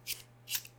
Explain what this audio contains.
Sound effects > Other
LIGHTER FLICK 14
flick, lighter, zippo